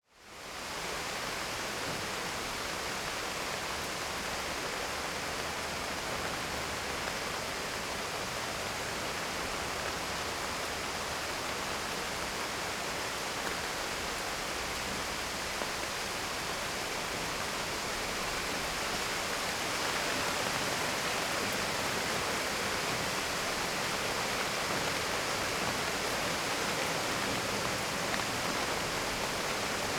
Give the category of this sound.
Soundscapes > Nature